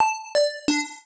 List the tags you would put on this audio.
Sound effects > Electronic / Design
alert notifications interface digital options UI menu button

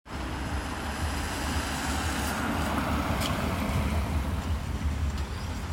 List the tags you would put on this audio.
Sound effects > Vehicles
rain tampere vehicle

